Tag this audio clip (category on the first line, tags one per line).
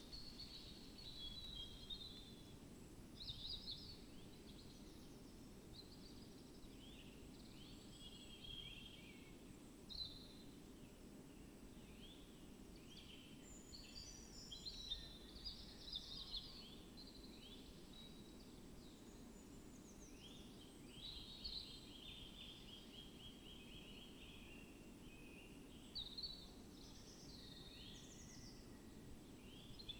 Soundscapes > Nature
natural-soundscape
phenological-recording
soundscape
artistic-intervention
raspberry-pi
alice-holt-forest
field-recording
sound-installation
nature
Dendrophone
data-to-sound
modified-soundscape
weather-data